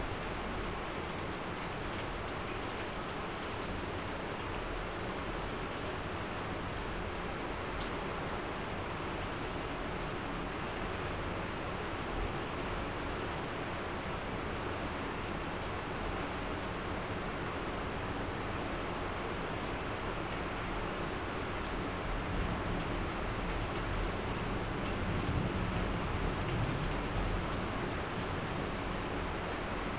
Soundscapes > Indoors
Recorded with H1 Essential